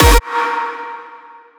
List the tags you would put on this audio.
Instrument samples > Percussion
Distortion GatedKick Hardstyle Kick PvcKick Rawstyle

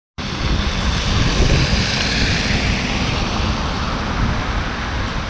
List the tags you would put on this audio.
Soundscapes > Urban
tires Car passing studded

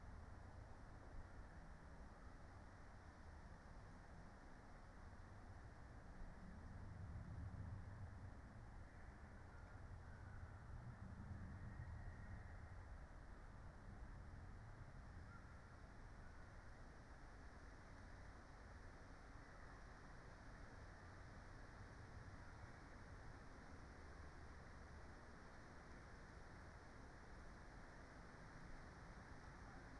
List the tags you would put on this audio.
Soundscapes > Nature
sound-installation raspberry-pi weather-data nature phenological-recording modified-soundscape artistic-intervention natural-soundscape data-to-sound field-recording soundscape alice-holt-forest Dendrophone

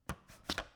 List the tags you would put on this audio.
Sound effects > Objects / House appliances
7000 aspirateur cleaner FR-AV2 Hypercardioid MKE-600 MKE600 Powerpro Powerpro-7000-series Sennheiser Shotgun-mic Shotgun-microphone Single-mic-mono Tascam Vacum vacuum vacuum-cleaner

